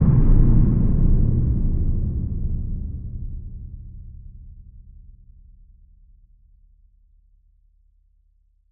Sound effects > Electronic / Design
LASTING RUMBLY RATTLING IMPACT
RAP, BOOM, TRAP, HIT, DIFFERENT, INNOVATIVE, EXPERIMENTAL, RUMBLING, HIPHOP, EXPLOSION, RATTLING, IMPACT, BASSY, UNIQUE, LOW, DEEP